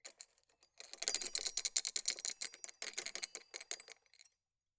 Sound effects > Other mechanisms, engines, machines
Woodshop Foley-065
rustle, strike